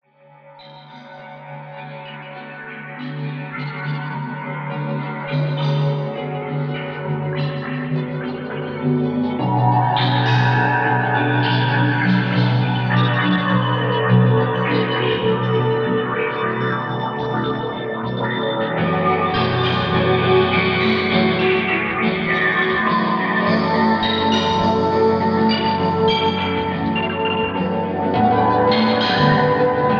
Music > Other
Infirm, Hidden
melancholic glitchy atmosphere
mel, soundscape